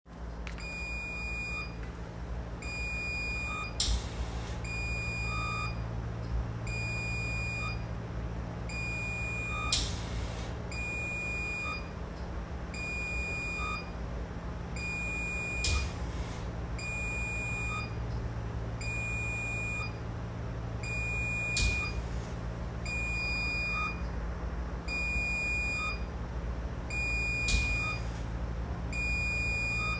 Soundscapes > Indoors
Meat cooler Alarm
I dont know why, but inside the Meat cooler, it makes this beeping while I do inventory audits. recorded with my iphone
alarm, appliances, refrigerator